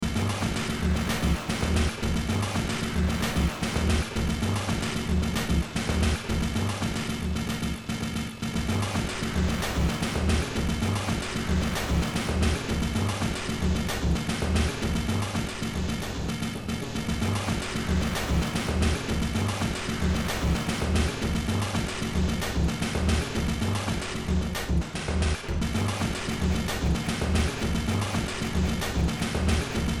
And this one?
Music > Multiple instruments
Short Track #2985 (Industraumatic)
Games, Underground, Soundtrack, Industrial, Ambient, Horror, Sci-fi, Cyberpunk, Noise